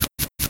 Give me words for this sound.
Sound effects > Other
Sewing Stitching Fixing Quick
Stitcher, hand-sewing, item-sound, Fixer, Fix, seamster, pop, Sewing, handsewing, fabrich, cloth, fixed, Stitching, Sewer, game, pin, textile, Stitch, pins, Stitched, Fixing, Sew, needle, puncture, clothing, thread, prick, seamstress, Quick